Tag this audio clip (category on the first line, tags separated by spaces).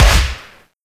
Sound effects > Electronic / Design
fire mix video-game